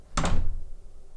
Sound effects > Objects / House appliances
Door Close 01
close, closing, door, house, indoor, slam